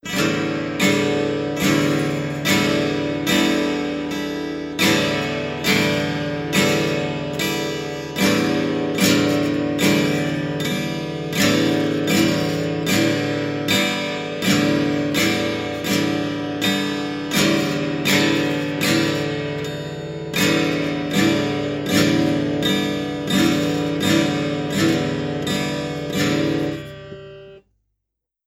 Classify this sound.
Music > Solo instrument